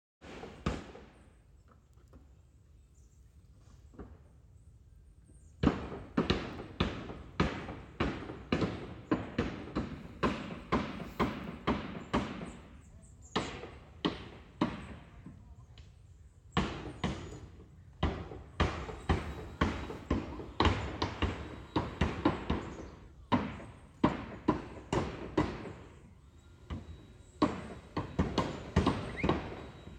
Soundscapes > Urban
Roofing Work in the Forest
Walking in the woods a group of roofers interrupted my thoughts. The reflections from the surrounding trees give this recording depth. Self Recorded on a Pixel 10 Pro